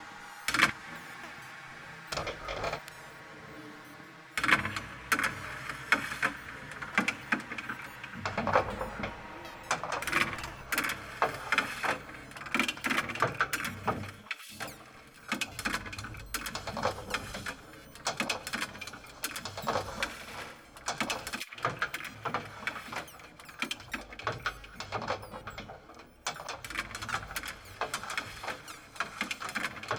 Sound effects > Experimental
Konkret Jungle 5
From a pack of samples focusing on ‘concrete’ and acousmatic technique (tape manipulation, synthetic processing of natural sounds, extension of “traditional” instruments’ timbral range via electronics). This excerpt is mainly based upon the sounds of bowed cymbal and other random foley noises, with classic "tape speed" manipulation and some more modern comb filter feedback.
objet-sonore, tape-manipulation, comb-filter, slicing, musique-concrete, bowed-cymbal, acousmatic, extended-technique